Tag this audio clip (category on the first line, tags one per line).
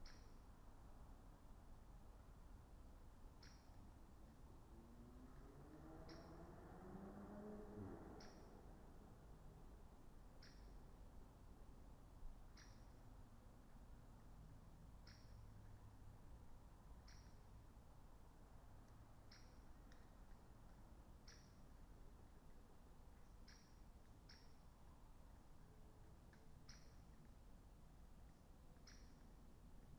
Soundscapes > Nature
alice-holt-forest
artistic-intervention
field-recording
modified-soundscape
natural-soundscape
nature
raspberry-pi
sound-installation
soundscape
weather-data